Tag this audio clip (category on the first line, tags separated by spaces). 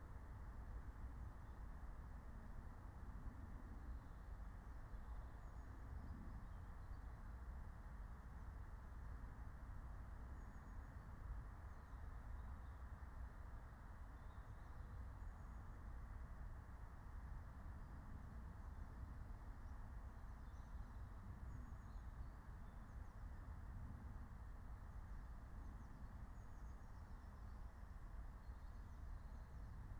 Soundscapes > Nature
phenological-recording raspberry-pi natural-soundscape alice-holt-forest field-recording nature meadow soundscape